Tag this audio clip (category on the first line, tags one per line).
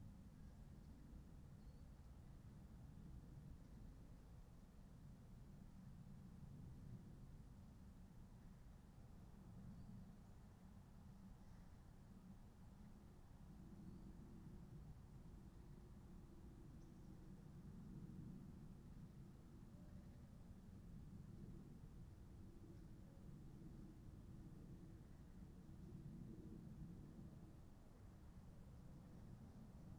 Soundscapes > Nature
soundscape
nature
alice-holt-forest
natural-soundscape
weather-data
phenological-recording
artistic-intervention
field-recording
sound-installation
data-to-sound
modified-soundscape
raspberry-pi
Dendrophone